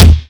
Instrument samples > Percussion
This tom is part of the Tama Star Classic Bubinga Tomset (every tom is in my tom folder). I uploaded the attacked and unattacked (without attacks) files. • tom 1 (hightom): 9×10" Tama Star Classic Bubinga Quilted Sapele • tom 2 (midtom): 10×12" Tama Star Classic Bubinga Quilted Sapele • tom 3 (lowtom): 14×14" Tama Star Classic Bubinga Quilted Sapele → floor 1 (lightfloor): 16×16" Tama Star Classic Bubinga Quilted Sapele • floor 2 (deepfloor): 14×20" Tama Star Classic Bubinga Gong Bass Drum tags: tom tom-tom Tama-Star Tama bubinga sapele 16x16-inch 16x16-inches bubinga death death-metal drum drumset DW floor floortom floortom-1 heavy heavy-metal metal pop rock sound-engineering thrash thrash-metal unsnared Pearl Ludwig Majestic timpano